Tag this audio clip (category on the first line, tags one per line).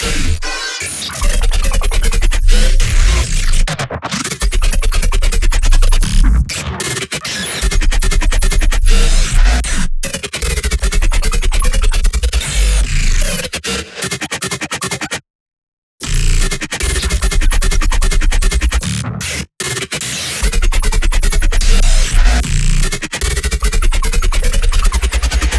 Instrument samples > Synths / Electronic
bass
dubstep
synth